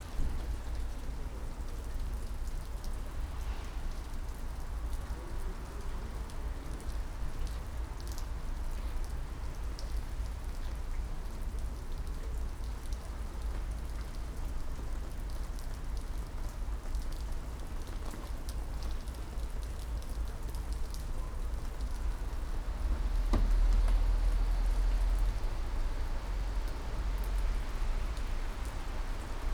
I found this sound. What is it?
Soundscapes > Nature
Rainy forest
Field recording of a forest with light rain, cars can be heard in the distance
field-recording, forest, wind